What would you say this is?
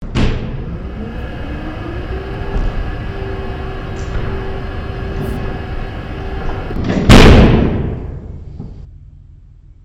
Sound effects > Other mechanisms, engines, machines
A hydraulic door sound composed of various sound effects throughout my house, such as my adjustable desk, ventilation ambience, and doors closing. Recorded on my laptop.